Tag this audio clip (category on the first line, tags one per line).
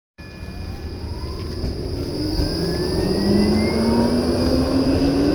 Soundscapes > Urban
recording
tram
Tampere